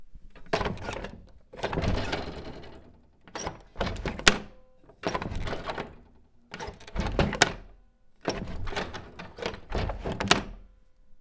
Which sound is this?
Sound effects > Objects / House appliances
SFX Indoor DoorOpenClose
Record Zoom h1n